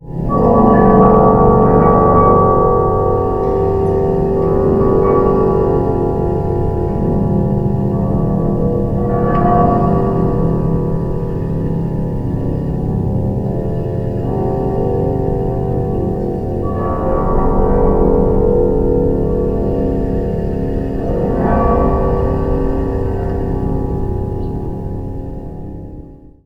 Soundscapes > Other
Text-AeoBert-Pad-06
A homemade elecric aeolian harp set up during a storm in Midlothian Scotland. These are some of the highlights from a 12 hour recording the reflect the violence and strength of the storm we had. The harp was set up on the roof of a shed and bore the brunt of the storm.
strings, dischordant, wind, storm